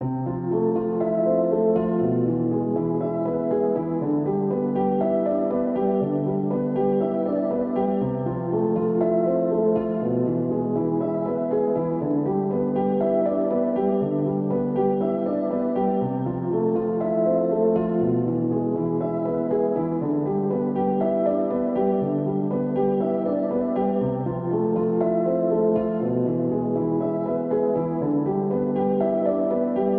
Music > Solo instrument
Piano loops 042 efect 4 octave long loop 120 bpm
simple, 120, reverb, free, samples, piano, 120bpm, simplesamples, loop, pianomusic, music